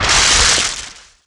Sound effects > Natural elements and explosions
LoFi FireIgnition-02
Lofi non-explosive ignition sound of a match or gas fire. Foley emulation using wavetable synthesis and noise tables.
activate, candle, burn, ignite, flame, crackle, start, fire, match, ignition, burning, stove